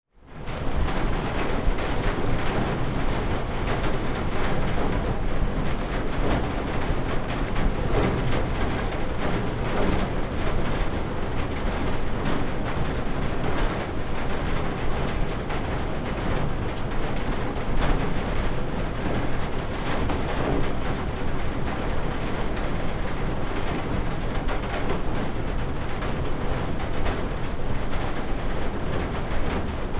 Soundscapes > Indoors
Ambient recording with rain. While recording I stand in the middle of the room and the microphone points on the closes window. Ambience of rain and room tone. Zoom F3 Rode NTG 5
roomtone, rain